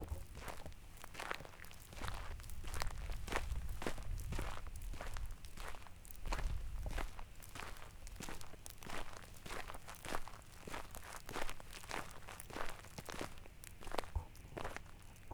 Sound effects > Human sounds and actions
Some steps on small gravel / dust road at night. low level ambient sounds also discernible. Unprocessed sound made with Zoom recorder